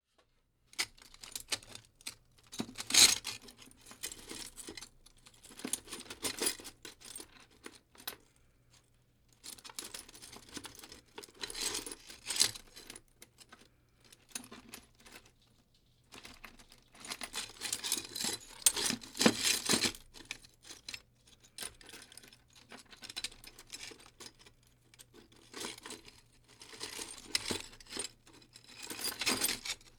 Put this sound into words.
Sound effects > Human sounds and actions
I used a box of broken glass to mimic the sound of someone walking or shuffling through broken glass on a floor. Made in a studio setting
broken, glass, scramble, scrape, shuffle